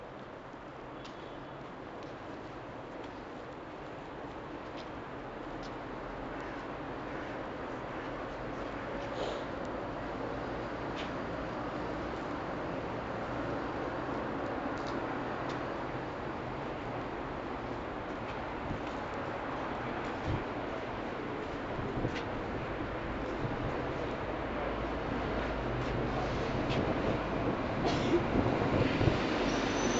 Soundscapes > Urban
Old recording, made probably with phone, during my 2015 January work commute. Part 6: My train This is the moment when my train arrives. You can hear it comming and some loud PA.
train, public